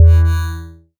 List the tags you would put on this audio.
Instrument samples > Synths / Electronic
bass
additive-synthesis

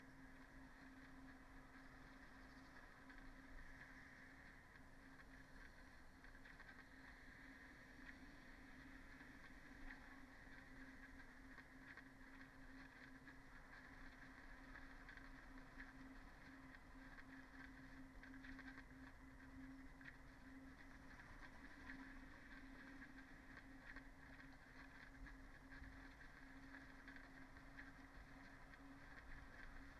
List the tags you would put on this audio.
Soundscapes > Nature
Dendrophone
modified-soundscape
nature
soundscape

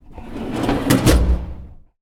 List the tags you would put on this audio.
Sound effects > Other mechanisms, engines, machines
metal
storage
latch
shut
action
sliding
noise
container
clank
pull
Closing
close
drawer
compartment
push
mechanical
furniture
handle
cabinet

